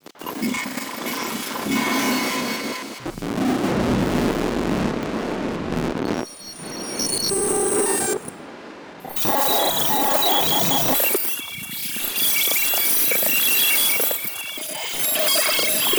Soundscapes > Synthetic / Artificial
Grain Space 2
free, glitch, granulator, noise, packs, sfx, sound-effects